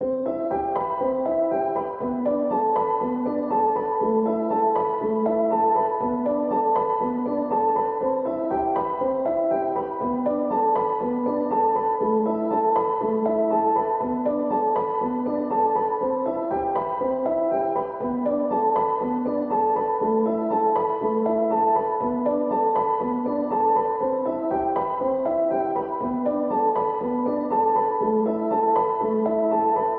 Music > Solo instrument
Piano loops 192 efect 4 octave long loop 120 bpm
120, 120bpm, free, loop, music, piano, pianomusic, reverb, samples, simple, simplesamples